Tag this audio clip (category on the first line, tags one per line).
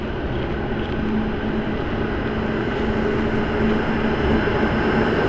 Sound effects > Vehicles
tramway
tram
field-recording
city
transportation